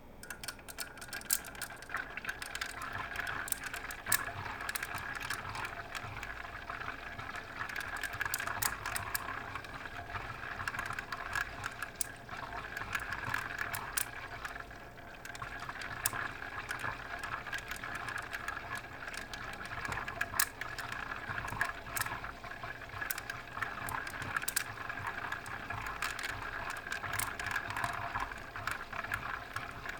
Sound effects > Natural elements and explosions
Water swirling with bunch of clattering.